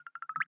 Sound effects > Objects / House appliances
Drips PipetteDripFast 1 Sequence
drip, water